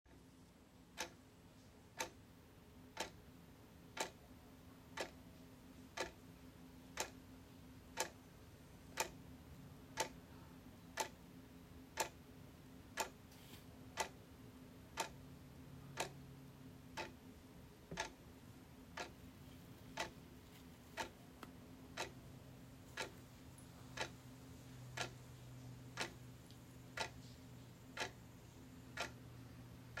Sound effects > Objects / House appliances
this one is recorded with an Clock inside the washing/drying room because usually, they are quiet in there. there were some noises in the audio like in: #0:45 or before the last tick. however, as of writing this, i don't have time to do so, if your fine with those sounds, that's good.
Ticking, Atmosphere, Indoor, Clock, Cinematic, Dark, Ambient